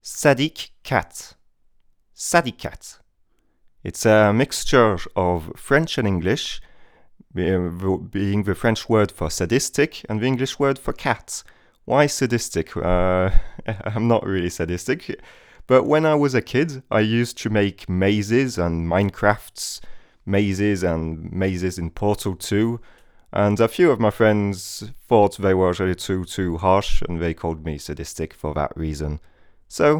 Speech > Solo speech
I'm half French half British, so that explains my accent. 2025 05 06 France.
Dare, Tascam, NT5, pseudonym, male, Rode, FR-AV2, Dare2025-07